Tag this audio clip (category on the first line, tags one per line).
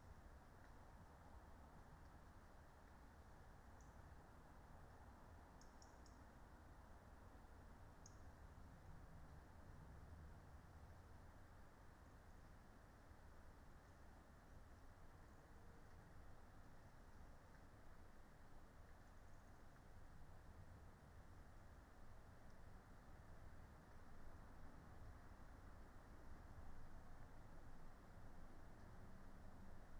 Soundscapes > Nature
nature
phenological-recording
weather-data
Dendrophone
soundscape
data-to-sound
alice-holt-forest
raspberry-pi
modified-soundscape
field-recording
sound-installation
natural-soundscape
artistic-intervention